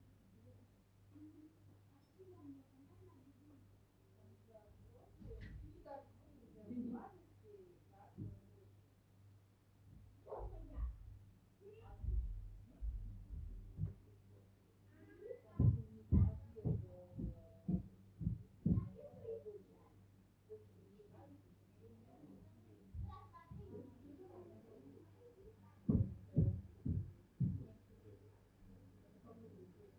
Soundscapes > Indoors
AMBHome Muffled Murmurs Through The Wall With Footsteps In an Apartment DASL SHORT
Next-door neighbors through the wall have a celebration with conversation and kids running around. Short Version. Recorded on Zoom M4 internal XY and was processed in iZotope RX.
Apartment, Murmurs, RoomTone, Ambiance, Muffled, Footsteps